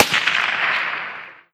Sound effects > Other mechanisms, engines, machines
Sniper Shot Echoey
Sniper show and some echo. Had to fade out early because of voices in the recording. The rifle appears to be a M110 Semi Automatic Sniper System (M110 SASS). Might need some mixing before use.
attack, dvids